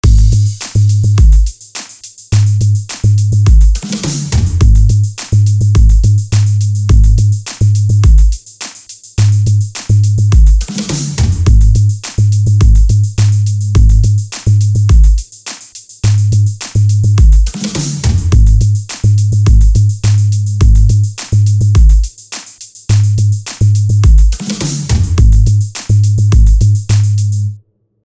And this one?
Music > Multiple instruments
Drums and Bass
A simple composition I made with Nexus. This composition is fantastic. Ableton live.